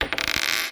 Sound effects > Objects / House appliances
OBJCoin-Samsung Galaxy Smartphone, CU Quarter, Drop, Spin 06 Nicholas Judy TDC
drop, foley, Phone-recording, quarter